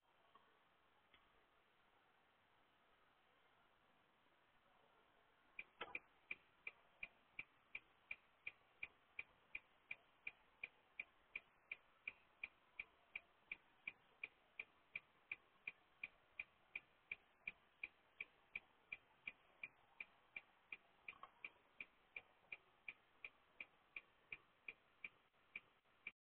Sound effects > Vehicles
A muffled version of the blinker for switching lanes.